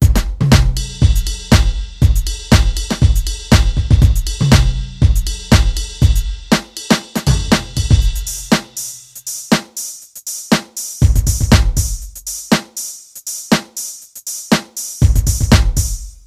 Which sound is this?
Music > Solo percussion
Compton Loop 120
Short Compton inspired loop
rap, groovy, beats, drum-loop, drum, drums, dance, hop, hip, beat, quantized, 120-bpm, loop, funky, kit